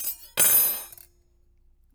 Sound effects > Objects / House appliances
Metal Tink Oneshots Knife Utensil 17
FX, Perc, ting, Wobble, ding, Vibrate, Foley, metallic, SFX, Trippy, Klang, Clang, Metal, Vibration